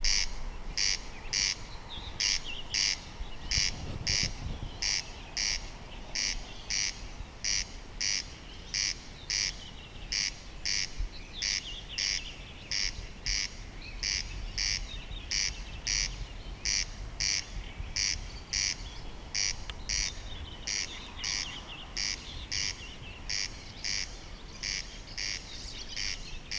Soundscapes > Nature
CORNCRAKE and other night birds 5
corncrake and other night birds recorded with Zoom H1n